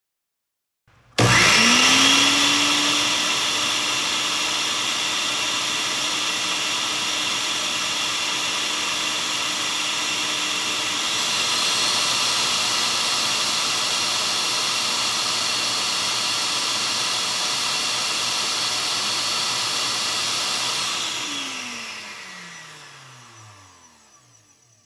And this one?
Sound effects > Other mechanisms, engines, machines
A blender with a mechanical noise, vibrations, and buzzing, its blades spinning rapidly powered by the motor.